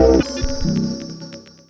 Instrument samples > Synths / Electronic
CVLT BASS 36
bass, bassdrop, clear, drops, lfo, low, lowend, stabs, subbass, subs, subwoofer, synth, synthbass, wavetable, wobble